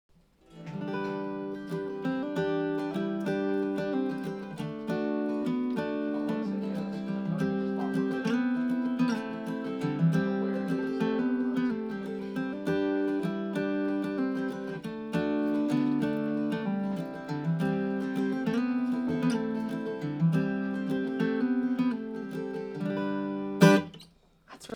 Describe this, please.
Music > Solo instrument
Recorded during a trip to Fort Worth with friends wherein we stopped at a Guitar Center and tested out some of their Guitars.